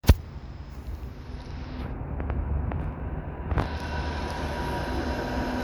Soundscapes > Urban
A tram passing the recorder in a roundabout. The sound of the tram passing along with some distortion can be heard. Recorded on a Samsung Galaxy A54 5G. The recording was made during a windy and rainy afternoon in Tampere.